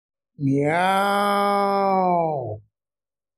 Sound effects > Human sounds and actions
sound angry cat mimicking by a human being
Mimicking the sound of an angry cat by a human being.
anger, angry, animal, animals, attack, barking, cat, cats, Creature, domestic, feline, kitten, kitty, meow, meowing, mew, pet, pets, purr, purring, Shelter